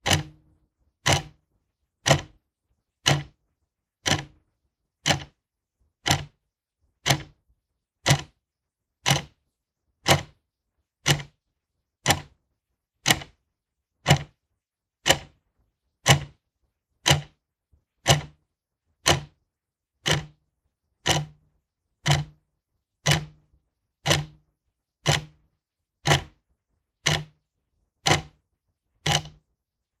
Sound effects > Objects / House appliances
Wall clock MKE600 Denoised
Subject : A home wall-clock ticking away. A denoised version Date YMD : 2025 July 12 Location : Albi 81000 Tarn Occitanie France. Sennheiser MKE600 P48, no filter.
wall-clock, processed, Tascam, tick, time, tick-tock, ticking, clock, Sennheiser, round-clock, FR-AV2, MKE600